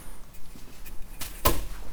Sound effects > Other mechanisms, engines, machines
shop foley
wood, pop, crackle, sfx, bop, tink, metal, rustle, shop, bang, sound, knock, tools, strike, oneshot, percussion, bam, perc, fx, thud, boom, little, foley